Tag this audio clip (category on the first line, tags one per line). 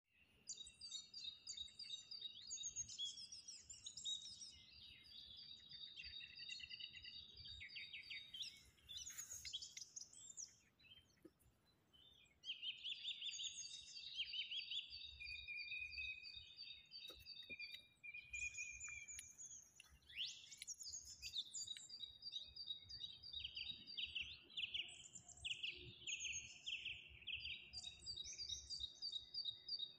Soundscapes > Nature
Austria
Birds
Carinthia
Environment
Forest
Nature